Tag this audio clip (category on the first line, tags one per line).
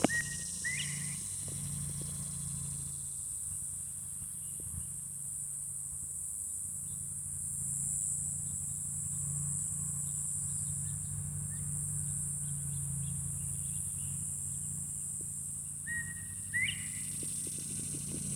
Sound effects > Animals
gamefowl
gamebird
field-recording
fowl
quail
bird
bobwhite